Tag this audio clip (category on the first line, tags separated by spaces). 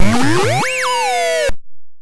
Sound effects > Electronic / Design
FX Infiltrator Trippy